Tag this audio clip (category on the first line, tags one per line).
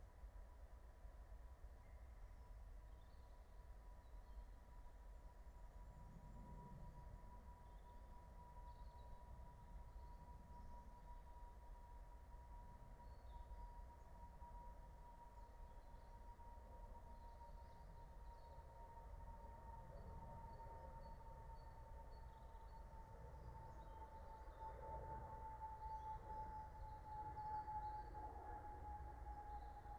Soundscapes > Nature
nature
soundscape